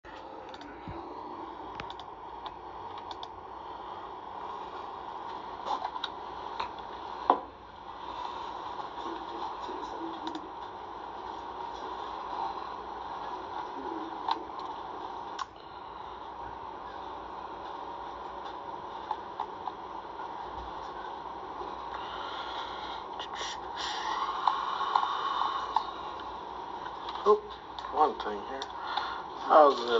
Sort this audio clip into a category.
Sound effects > Human sounds and actions